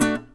Music > Solo instrument
acoustic guitar quick happy chord
acosutic, chord, chords, dissonant, guitar, instrument, knock, pretty, riff, slap, solo, string, strings, twang